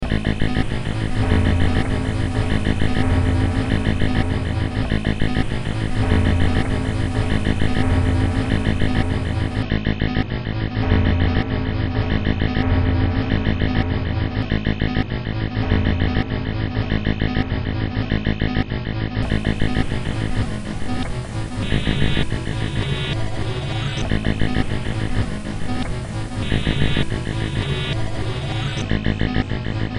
Music > Multiple instruments
Demo Track #3028 (Industraumatic)
Ambient, Cyberpunk, Games, Horror, Industrial, Noise, Sci-fi, Soundtrack, Underground